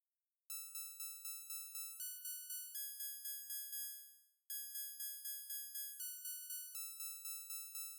Music > Solo instrument
A simple bell synthesizer riff
Bell, Pluck, Ring